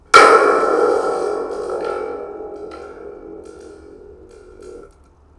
Music > Solo percussion
MUSCPerc-Blue Snowball Microphone, CU Thunder Tube, Crash Nicholas Judy TDC
A single thunder tube crash.